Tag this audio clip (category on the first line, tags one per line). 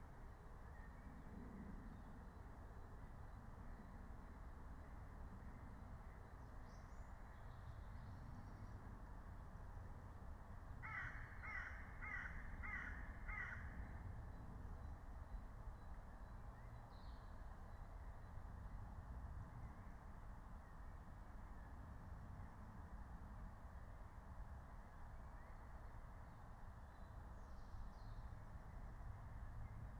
Soundscapes > Nature
soundscape
natural-soundscape